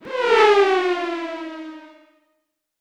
Sound effects > Vehicles
Car pass (slow)
This suound can be used when you`re passing a car (in game), an orriginal pass sound
Car, Cars, Driving